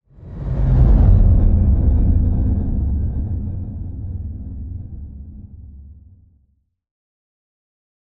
Sound effects > Other
Sound Design Elements Whoosh SFX 031
audio, sound, whoosh, element, elements, swoosh, production, film, trailer, movement, effect, ambient, sweeping, fast, cinematic, effects, transition, design, dynamic, motion, fx